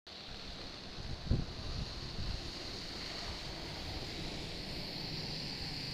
Sound effects > Vehicles
tampere bus4
bus, vehicle